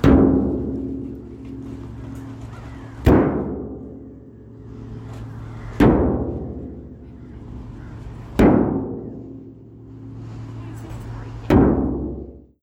Sound effects > Objects / House appliances
METLImpt-Samsung Galaxy Smartphone, CU Giant Tub Nicholas Judy TDC
Giant metal tub impacts. Recorded at The Home Depot.
giant, metal, Phone-recording, tub